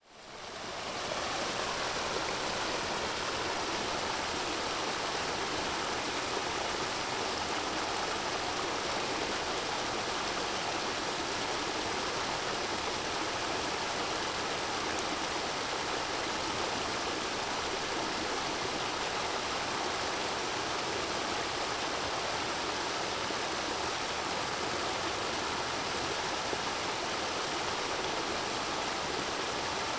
Soundscapes > Nature
Gulpha Campround Gorge Creek Closer
Nighttime ambiance recorded in the Gulpha Gorge Campground, Hot Springs National Park, AR, USA. Flowing Water can be heard. Close perspective. An Olympus LS-11 linear PCM recorder was used to record this 16-bit field audio recording.
babbling, brook, campground, creek, exterior, flowing, outdoors, stream, water